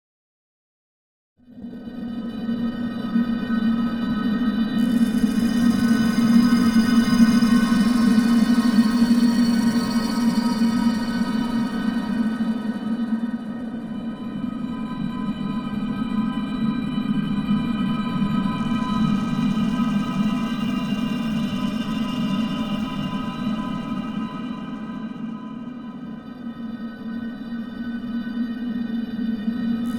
Soundscapes > Synthetic / Artificial
Abandoned Sci-Fi
Atmospheric soundscape made for sci-fi airy ambience. Hope you enjoy! Also don't forget to leave a rating as it really helps!
Halloween, Tense, Atmosphere, Video-game, Scary, Film, Ambience, sci-fi, Ambient, Spooky, Mystery, Ghost, Space, Alien, Cinematic, Atmospheric, Horror, dark, Creepy